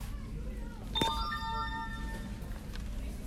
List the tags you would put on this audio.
Sound effects > Other mechanisms, engines, machines
retail scanner electronic

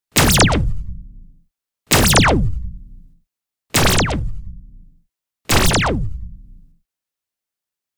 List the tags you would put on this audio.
Sound effects > Electronic / Design
punch future fate koyama spell teleport fx punchy fire anime sfx futuristic sci-fi shot energy stay impact explosion laser magic gaebolg yasumasa

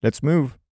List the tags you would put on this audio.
Speech > Solo speech
Voice-acting lets-move Adult mid-20s Single-mic-mono Calm Shotgun-microphone Sennheiser Shotgun-mic movement Male Hypercardioid Generic-lines july FR-AV2 Tascam MKE-600 MKE600 VA 2025

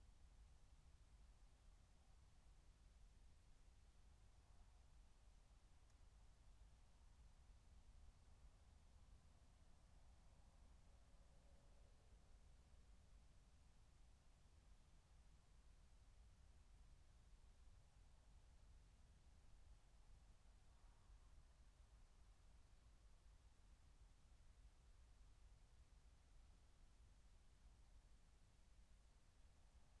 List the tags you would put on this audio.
Soundscapes > Nature
nature field-recording raspberry-pi soundscape phenological-recording alice-holt-forest meadow natural-soundscape

